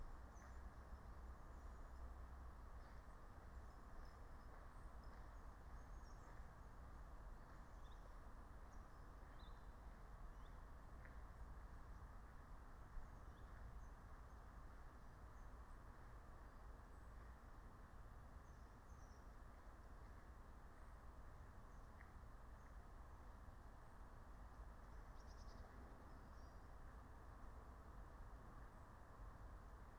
Soundscapes > Nature
Automatic recording from a wood near Alice Holt Lodge Pond, Surrey, UK. Recorded with a DIY Raspberry Pi audio streamer designed by Luigi Marino. Before Feb 28th 2025, the recordings were done using MEMs microphones. Since Feb 28th 2025, the quality of the recordings has improved considerably because of changes in the equipment, including switching to Rode LavalierGO mics with Rode AI-Micro audio interface and software updates. This solar-powered system is typically stable, but it may go offline due to extreme weather factors. This recording is part of a natural soundscape dataset captured four times a day according to solar time (sunrise, solar noon, sunset, and at the midpoint between sunset and sunrise). The main tree species is Corsican pine, planted as a crop in 1992, and there are also mixed broadleaf species such as oak, sweet chestnut, birch, and willow. The animal species include roe deer, muntjac deer, and various birds, including birds of prey like buzzard and tawny owl.